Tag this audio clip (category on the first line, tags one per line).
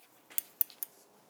Sound effects > Objects / House appliances
cut
foley
fx
household
metal
perc
scissor
scissors
scrape
sfx
slice
snip
tools